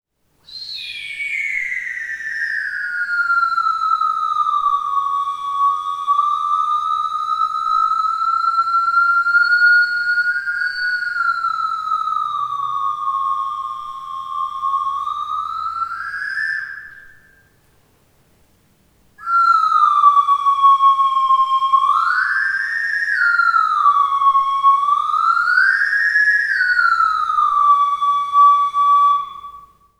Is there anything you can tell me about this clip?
Soundscapes > Urban
Plunger sound recorded in a Basement with a Zoom H5 recorder.